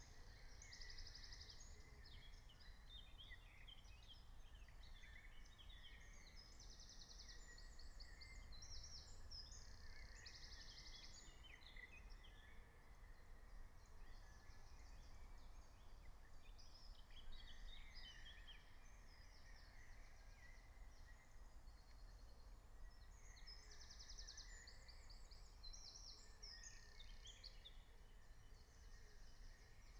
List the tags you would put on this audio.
Soundscapes > Nature
alice-holt-forest
natural-soundscape
nature
phenological-recording
raspberry-pi
soundscape

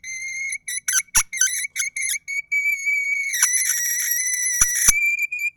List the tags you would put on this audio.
Sound effects > Experimental
squeaking
horror
screech
scrape
creepy
terror
loud
metal

